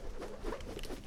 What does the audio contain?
Sound effects > Objects / House appliances
Rope Swinging around.
nunchucks, swhish, swoosh, swirl, woosh, swosh, flup, swish, swash, nunchaku, rope, swing, whoosh, wish